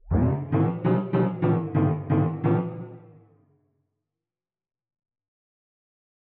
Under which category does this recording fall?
Music > Other